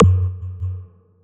Instrument samples > Percussion
192bpm - ShamanKick - Master
Made with 80freq Tone in Audacity. I call those creations Paw Step.
drum, generated, sound, kick, sample